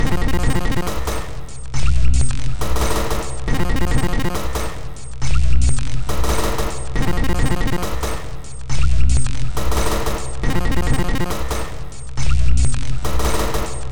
Instrument samples > Percussion
This 138bpm Drum Loop is good for composing Industrial/Electronic/Ambient songs or using as soundtrack to a sci-fi/suspense/horror indie game or short film.
Alien, Ambient, Dark, Drum, Loop, Loopable, Samples, Soundtrack, Underground, Weird